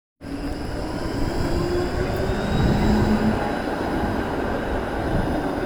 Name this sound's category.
Sound effects > Vehicles